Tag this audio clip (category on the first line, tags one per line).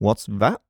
Speech > Solo speech
Adult
MKE-600
Sennheiser
Shotgun-mic
VA
whats-that